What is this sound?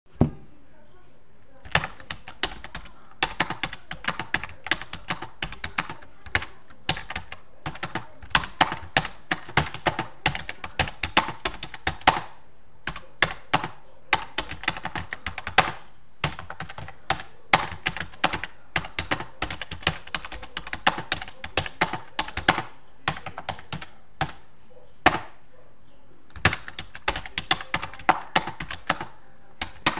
Sound effects > Objects / House appliances
Computer Keyboard Typing
Me typing on a computer keyboard. Recorded with Android mobile phone.
typing, keyboard, computer, type